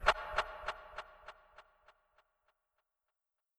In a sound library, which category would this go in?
Sound effects > Other mechanisms, engines, machines